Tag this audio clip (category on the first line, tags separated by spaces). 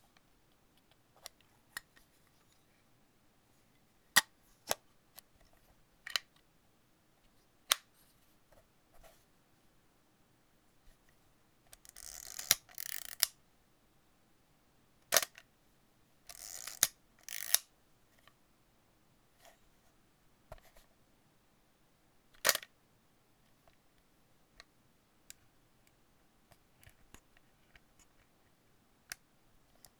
Sound effects > Objects / House appliances
Antique Analog Film Camera 35mm